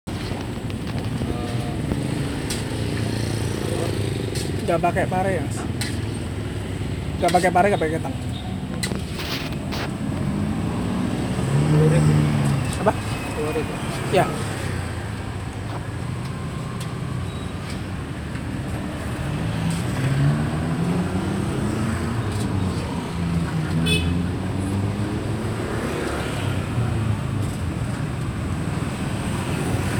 Soundscapes > Urban
A recording of me purchasing Batagor (Indonesian fried fish cakes) from a roadsite street cart vendor on a Wednesday afternoon around lunch rush. Some Indonesian speech can be heard, frying sounds can be heard, mostly overwhelmed by very loud vehicle traffic.